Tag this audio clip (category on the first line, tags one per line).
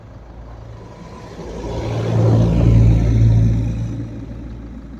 Sound effects > Vehicles
automobile
car
vehicle